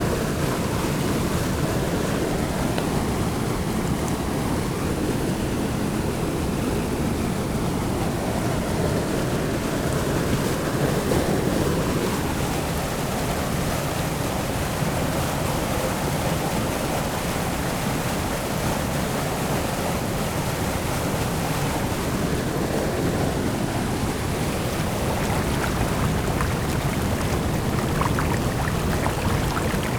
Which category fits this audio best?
Soundscapes > Nature